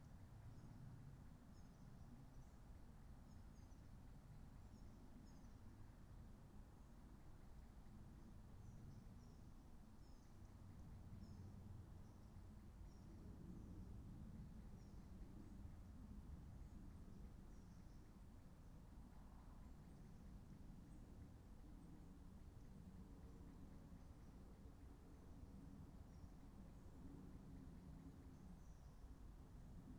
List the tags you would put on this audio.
Soundscapes > Nature
sound-installation
field-recording
artistic-intervention
soundscape
natural-soundscape
Dendrophone
alice-holt-forest
phenological-recording
weather-data
data-to-sound
raspberry-pi
modified-soundscape

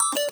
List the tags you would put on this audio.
Sound effects > Electronic / Design
UI
alert
interface

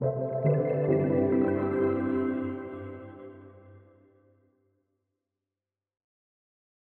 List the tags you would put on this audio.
Sound effects > Electronic / Design
android
apple
application
command
computer
end
game
interface
notification
program
robot
sfx
videogame
windows

